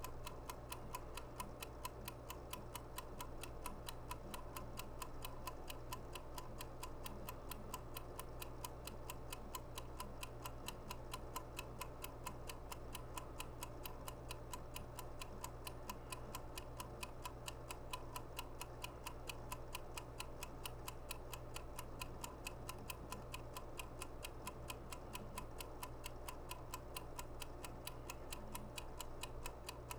Sound effects > Objects / House appliances
CLOCKTick-Blue Snowball Microphone Timer Ticking Nicholas Judy TDC
A timer ticking.
Blue-brand, Blue-Snowball, cooking, egg, tick, timer